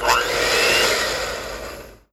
Sound effects > Objects / House appliances
An electric mixer turning on, running at low speed and turning off. Short.